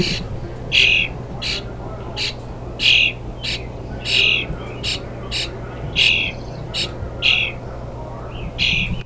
Sound effects > Animals
Baby birds, I think robins, recorded in their nest
bird, nature, birdsong, birds, field-recording